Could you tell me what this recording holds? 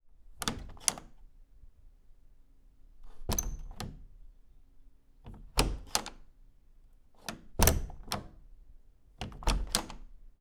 Sound effects > Objects / House appliances
Door Handle Recorded that sound by myself with Recorder - H1 Essential